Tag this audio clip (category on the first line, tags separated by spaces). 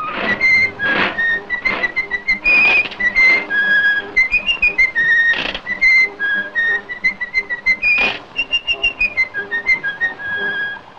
Music > Solo instrument
1920s cartoon disney mickey-mouse